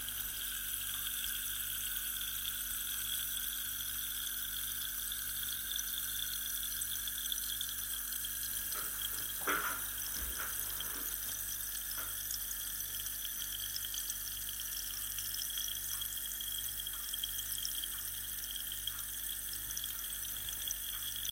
Sound effects > Natural elements and explosions
Fizzy Drink Bubbling – Rough Ambient Snippet
This ambient snippet features the bubbling sound of a fizzy drink, recorded on an older mobile phone. The lo-fi quality gives it a raw, organic texture, suitable for layering or adding subtle background ambiance to your projects.
sound-design, soda, ambient